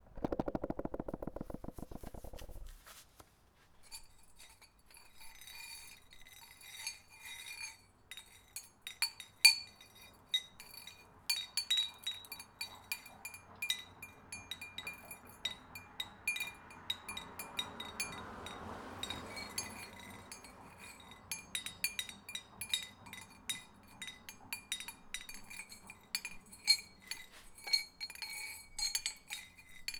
Soundscapes > Urban
small metal tubes brushed against concrete arrhythmically. A car also drives by. Recorded on a zoom h1n field recorder.